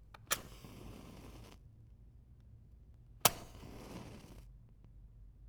Sound effects > Objects / House appliances
lighting matchstick
Lighting two matchsticks individually. Recorded with Zoom H2.
fire, matchstick